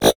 Sound effects > Electronic / Design
RGS-Glitch One Shot 15
Effect, FX, One-shot, Glitch, Noise